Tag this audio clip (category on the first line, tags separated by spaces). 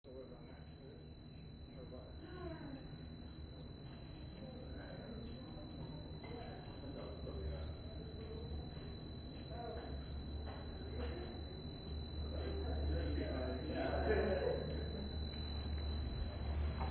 Sound effects > Other mechanisms, engines, machines
safety tone noise screen digital electronic scan medical